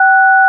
Sound effects > Electronic / Design
This is the number 6 in DTMF This is also apart of the pack 'DTMF tones 0-9'
dtmf, retro